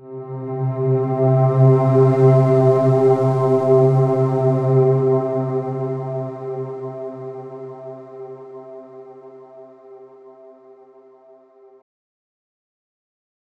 Instrument samples > Synths / Electronic
Deep Pads and Ambient Tones7
From a collection of 30 tonal pads recorded in FL Studio using various vst synths